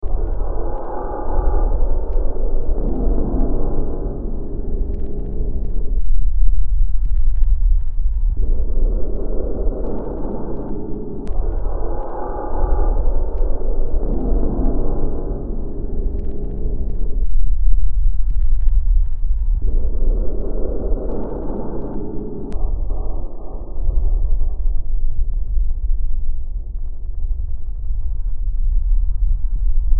Music > Multiple instruments
Demo Track #3365 (Industraumatic)
Soundtrack, Industrial, Sci-fi, Horror, Ambient, Underground, Games, Noise, Cyberpunk